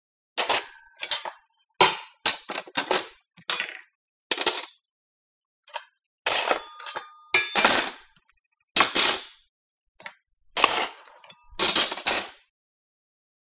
Sound effects > Objects / House appliances
Forks, knives and spoons being placed on a table. Recorded with Zoom H6 and SGH-6 Shotgun mic capsule.